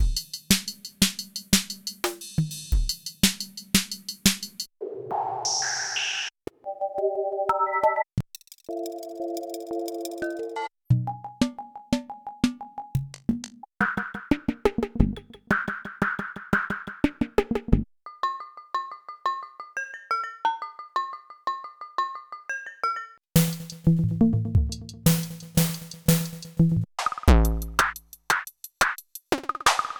Music > Solo percussion
Beat Glitch
Scrolling through presets on Nord Drum 3p while playing a MIDI loop, 32 bars at 176 bpm. There are some glitch sounds as the presets load.
glitch, hi-hat, snare, kick